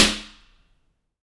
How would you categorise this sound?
Soundscapes > Other